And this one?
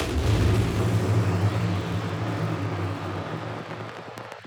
Sound effects > Experimental
destroyed glitchy impact fx -016
abstract, alien, clap, crack, edm, experimental, fx, glitch, glitchy, hiphop, idm, impact, impacts, laser, lazer, otherworldy, perc, percussion, pop, sfx, snap, whizz, zap